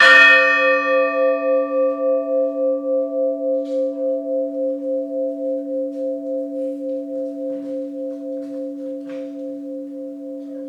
Instrument samples > Percussion
Orthodox bell. Recorded on the phone.